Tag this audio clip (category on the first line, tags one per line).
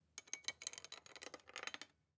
Sound effects > Other mechanisms, engines, machines
noise
sample